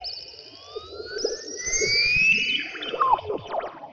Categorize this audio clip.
Soundscapes > Synthetic / Artificial